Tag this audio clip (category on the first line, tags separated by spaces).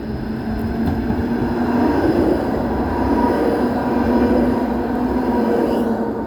Sound effects > Vehicles
Tampere tram moderate-speed embedded-track passing-by